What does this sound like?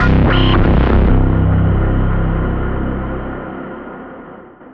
Instrument samples > Synths / Electronic
CVLT BASS 19
lowend, wobble, wavetable, subbass, bassdrop